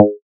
Instrument samples > Synths / Electronic
FATPLUCK 4 Ab
additive-synthesis, fm-synthesis